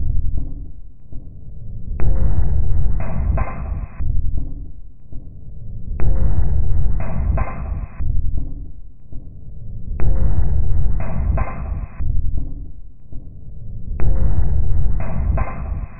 Soundscapes > Synthetic / Artificial
This 120bpm Ambient Loop is good for composing Industrial/Electronic/Ambient songs or using as soundtrack to a sci-fi/suspense/horror indie game or short film.
Loop, Loopable, Samples, Weird, Soundtrack